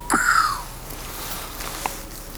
Sound effects > Objects / House appliances
weird blow and whistle mouth foley-001
mechanical, bonk, foley, hit, sfx, fx, oneshot, drill, fieldrecording, natural, glass, percussion, object, perc, metal, foundobject, industrial, stab, clunk